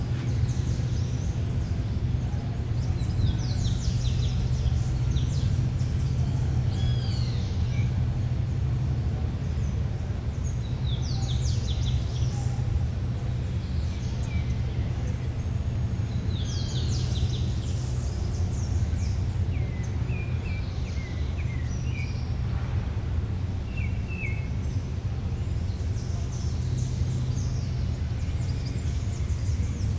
Soundscapes > Urban
Field recording in the botanical garden of Rio de Janeiro. Date: 2025/12/01 Time: 9 am Recorder: Rode Wireless Pro w. internal microphones